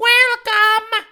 Speech > Solo speech
welcome puppet
speech, voice, english